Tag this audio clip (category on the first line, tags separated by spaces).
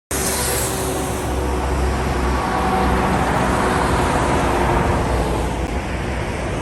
Sound effects > Vehicles
highway road truck